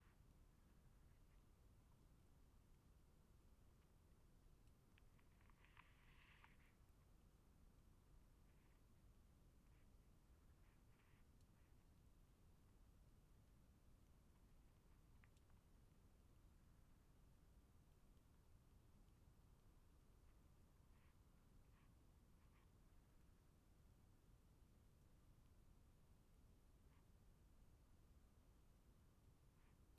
Soundscapes > Nature
Golena San Massimo underwater loc1
Waterscape - underwater sounds in Golena san massimo in Padova, Italy. Recorded with selfmade hydrophone + zoom H1
field-recording, hydro-scape, water